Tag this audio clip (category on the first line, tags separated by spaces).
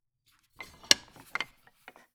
Music > Solo instrument
block foley fx keys loose marimba notes oneshotes perc percussion rustle thud tink wood woodblock